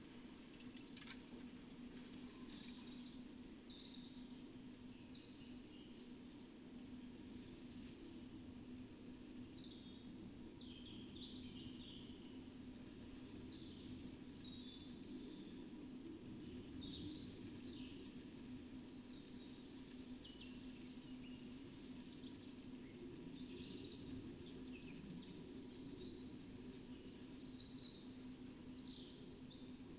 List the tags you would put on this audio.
Soundscapes > Nature
phenological-recording alice-holt-forest artistic-intervention weather-data raspberry-pi nature data-to-sound soundscape sound-installation field-recording modified-soundscape Dendrophone natural-soundscape

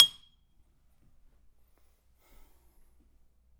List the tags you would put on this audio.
Sound effects > Objects / House appliances
glass oneshot drill metal fx mechanical stab fieldrecording percussion sfx hit foley natural industrial perc object bonk clunk foundobject

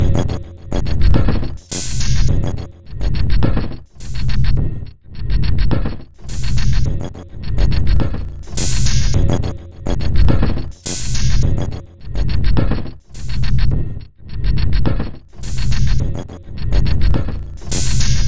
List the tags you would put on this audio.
Instrument samples > Percussion
Weird Drum Loop Dark Loopable Ambient Samples Packs

